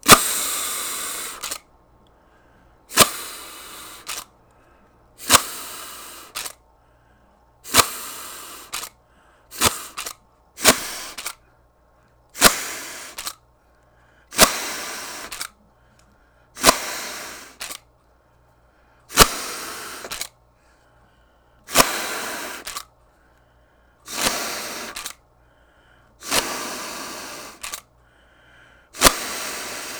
Sound effects > Objects / House appliances
FOLYProp-Blue Snowball Microphone, CU Party Blowout, No Horn Nicholas Judy TDC
A party blowout without horn.
Blue-Snowball, Blue-brand, party, blowout, foley